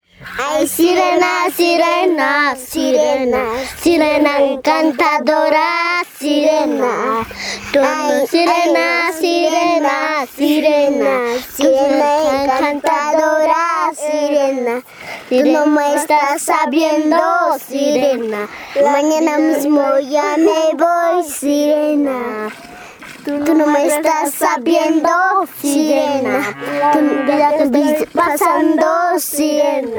Speech > Conversation / Crowd
VOCAL - SIRENA CANTAN NIÑAS CON VACA DE FONDO - PERU
Vocal Sound. Girls singing together in unison a huayno, a typical Andean rhythm. A cow can be heard nearby.